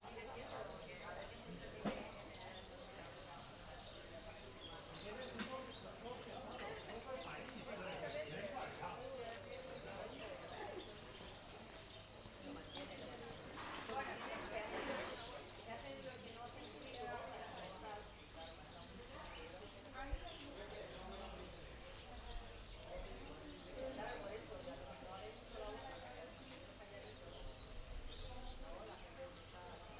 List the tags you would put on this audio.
Sound effects > Human sounds and actions
FOLEY
AMBIENCE
BACKGROUND
MALLORCA

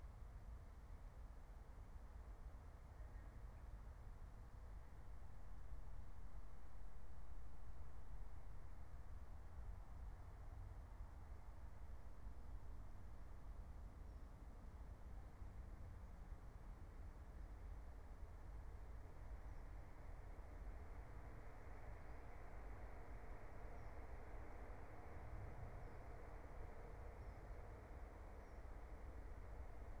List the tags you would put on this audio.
Soundscapes > Nature
raspberry-pi
field-recording
alice-holt-forest
soundscape
meadow
phenological-recording
natural-soundscape
nature

